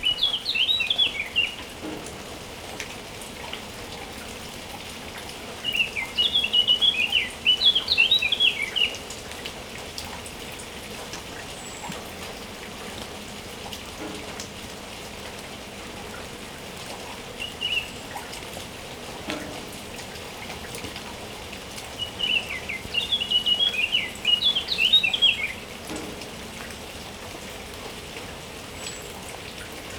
Soundscapes > Nature
Birds in the rain stereo
Hear birds singing in the rain. Recorded in a mountain village in Switzerland
nature, rain